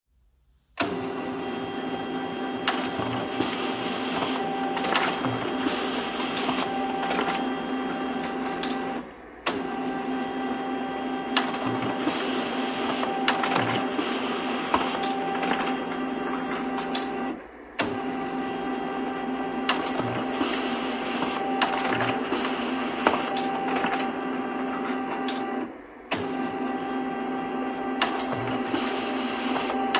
Sound effects > Other mechanisms, engines, machines
printer copier office-noises
Office sound effects of printer/copying machine working.
field-recording, office-sounds